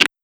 Sound effects > Human sounds and actions
Shoes on stone and rocks, running. Lo-fi. Foley emulation using wavetable synthesis.